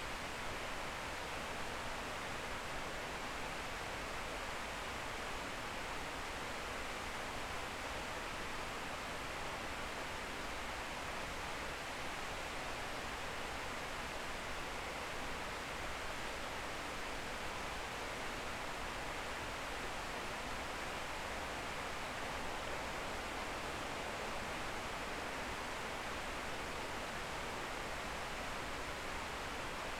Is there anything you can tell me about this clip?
Soundscapes > Urban
WATRFall Dam with waterfall next to a water treatment facility, Lyckeby, Sweden
Recorded 18:21 08/04/25 Standing on a viewing point next to a waterfall going over a dam. This river infrastructure works as a power supply, a water source for a nearby water treatment building, and it even has a long ramp along a hill which allows fish to travel up the stream. The sound is mostly just the water cascading down, but sometimes there’s some faint traffic and bird sounds. Zoom H5 recorder, track length cut otherwise unedited.
Flow, Lyckeby, Waterfall